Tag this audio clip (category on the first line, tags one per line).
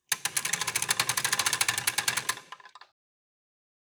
Sound effects > Other mechanisms, engines, machines
chain
gears
loadingdoor
machinery
mechanical